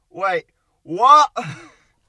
Speech > Solo speech
Wait WHAT (distant)
A dude saying "wait what" in a van. Mic on Driver side, speaker (me) on passanger side. Recorded with a Tascam FR-AV2 and Shure SM57 with A2WS windcover.
distant, Wait-WHAT, WHAT, 20s, dude, mid-20s, male, shouting, wait